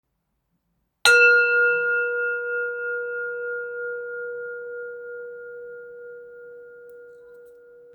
Sound effects > Other
Ringing the singing bowl on my desk. Not 100% what frequency it is, maybe 639hz.